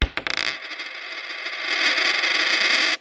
Sound effects > Objects / House appliances
OBJCoin-Samsung Galaxy Smartphone, CU Quarter, Drop, Spin 07 Nicholas Judy TDC
A quarter dropping and spinning.
drop, quarter, Phone-recording, spin, foley